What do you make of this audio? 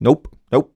Speech > Solo speech
Displeasure - Nope no
dialogue, displeasure, FR-AV2, Human, Male, Man, Mid-20s, Neumann, No, Nope, NPC, oneshot, singletake, Single-take, talk, Tascam, U67, Video-game, Vocal, voice, Voice-acting